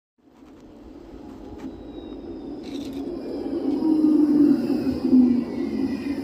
Soundscapes > Urban
final tram 24
finland, tram, hervanta